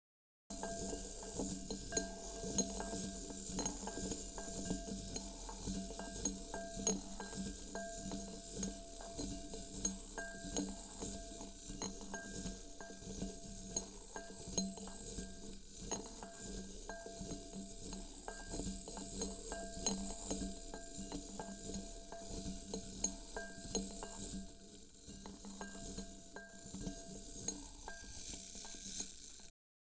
Sound effects > Electronic / Design
WindUp Toy - Glass Bowl
ZoomH6 recording device with standard XY stereo mic to capture a children’s metal windup toy dancing in a hollow glass bowl.